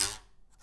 Sound effects > Other mechanisms, engines, machines
metal shop foley -139
thud, perc, fx, metal, oneshot, pop, sfx, little, bop, strike, tools, knock, tink, wood, boom, crackle, percussion, bang, foley, shop, rustle, sound, bam